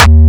Instrument samples > Percussion
Classic Crispy Kick 1- +1octD#
brazilianfunk, powerful, Kick, crispy, distorted, powerkick